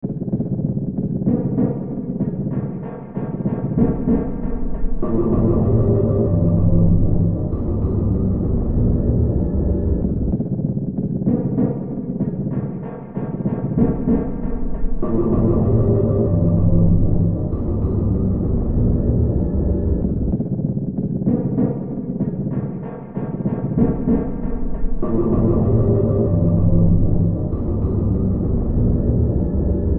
Soundscapes > Synthetic / Artificial
Looppelganger #174 | Dark Ambient Sound
Use this as background to some creepy or horror content.